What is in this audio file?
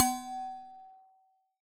Sound effects > Objects / House appliances
Resonant coffee thermos-019
percusive, recording, sampling